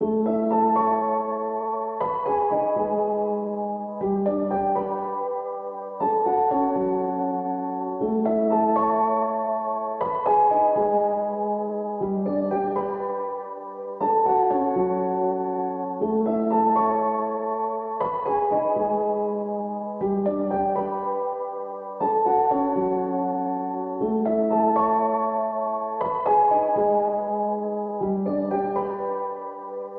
Music > Solo instrument
Piano loops 105 efect 4 octave long loop 120 bpm
120, 120bpm, free, loop, music, piano, pianomusic, reverb, samples, simple, simplesamples